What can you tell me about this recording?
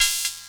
Instrument samples > Synths / Electronic
606ModHH OneShot 03
Analog, HiHat